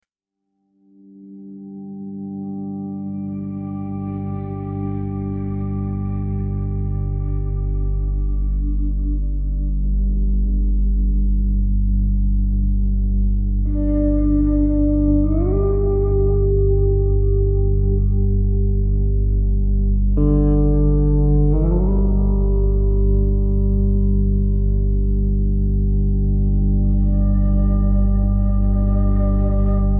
Instrument samples > Other
Drift space amb
low attack, no percussion, celestial